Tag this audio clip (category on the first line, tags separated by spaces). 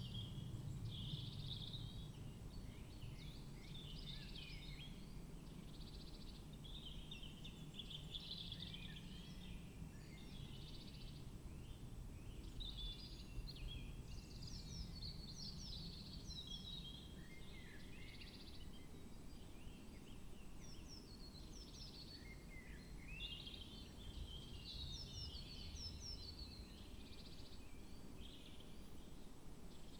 Soundscapes > Nature
phenological-recording soundscape field-recording data-to-sound Dendrophone alice-holt-forest nature weather-data modified-soundscape artistic-intervention raspberry-pi sound-installation natural-soundscape